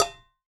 Sound effects > Objects / House appliances
Big pot top 2
A single-hit on the top of a large metal pot with a drum stick. Recorded on a Shure SM57.